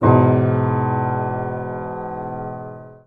Music > Solo instrument
F chord on piano. Recorded by myself on a Zoom Audio Recorder.
piano, keys, chord